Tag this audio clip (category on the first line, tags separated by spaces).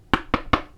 Sound effects > Objects / House appliances
door knock knocks